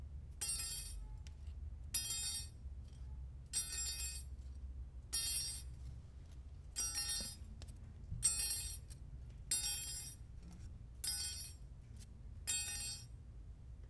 Sound effects > Objects / House appliances
item drop hard metal thin steel wire
Thin, twisted, short, hard steel wire dropped from a low distance on a cold floor. Can be turned into metallic hits or stomps with enough edition. Recorded with Zoom H2.
cold, floor, wire